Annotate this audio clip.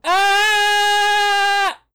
Speech > Solo speech
VOXScrm-Blue Snowball Microphone, CU Scream, Medium Nicholas Judy TDC
A medium scream.
Blue-brand, Blue-Snowball, medium, scream